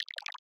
Instrument samples > Percussion
Organic-Water Snap 8
This snap synthed with phaseplant granular, and used samples from bandlab's ''FO-REAL-BEATZ-TRENCH-BEATS'' sample pack. Processed with multiple ''Khs phaser'', and Vocodex, ZL EQ, Fruity Limiter. Enjoy your ''water'' music day!
Snap, EDM, Botanical, Organic